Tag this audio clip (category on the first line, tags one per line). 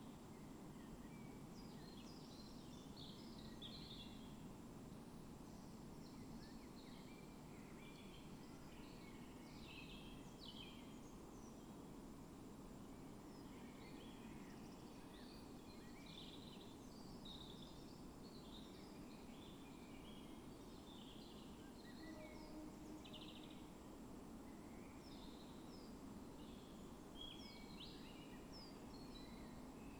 Soundscapes > Nature
phenological-recording
data-to-sound
natural-soundscape
weather-data
field-recording
raspberry-pi
Dendrophone
artistic-intervention
modified-soundscape
alice-holt-forest
nature
soundscape
sound-installation